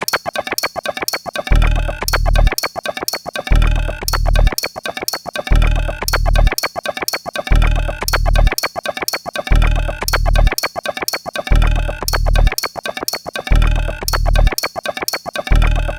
Music > Multiple instruments
Industrial loop created with Elektron Digitakt 2 and the Audioworks Biomorph sound pack